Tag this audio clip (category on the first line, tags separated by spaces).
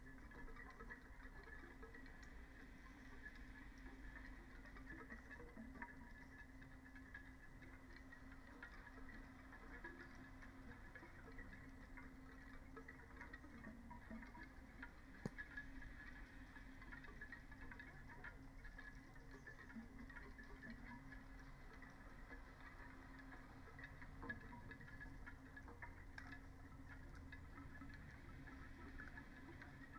Soundscapes > Nature
artistic-intervention data-to-sound Dendrophone field-recording natural-soundscape nature phenological-recording raspberry-pi sound-installation soundscape weather-data